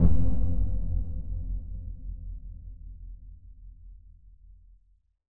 Sound effects > Electronic / Design
METALLIC RATTLING LOW EXPLOSION
BASSY, UNIQUE, LOW, EXPLOSION, HIPHOP, INNOVATIVE, TRAP, BOOM, IMPACT, EXPERIMENTAL, RUMBLING, RAP, DIFFERENT, DEEP, HIT, RATTLING